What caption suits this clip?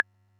Instrument samples > Percussion
clave casiotone
Sampleando mi casiotone mt60 con sus sonidos de percusión por separado Sampling my casiotone mt60 percusion set by direct line, sparated sounds!
percusion, sample, casiotone